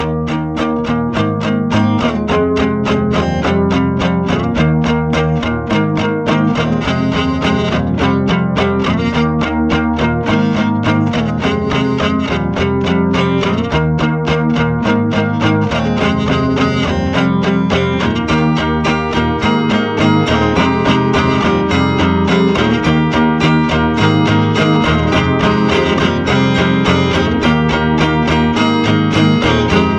Music > Solo instrument
scrappy 1990s indie guitar tune - slowed / pitched down
Rough, scrappy 1990s sounding indie guitar loop. Made with a Fender guitar, Logic Pro and Boss DM-2. No drums, good for sampling or background music. Slowed down, pitched down sound.